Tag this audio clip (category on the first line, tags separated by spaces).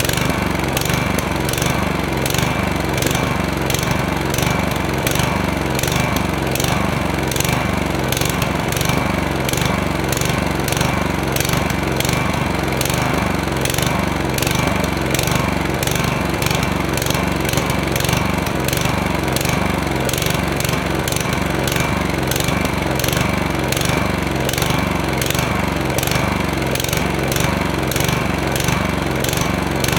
Sound effects > Other mechanisms, engines, machines
shutoff 4-stroke stall engine four-stroke lawnmower motor lawn-equipment